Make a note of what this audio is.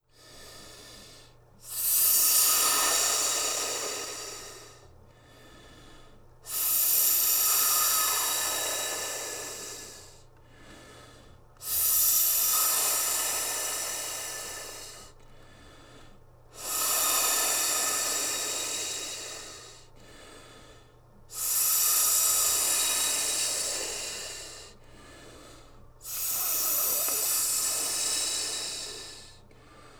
Sound effects > Animals
A snake snoring.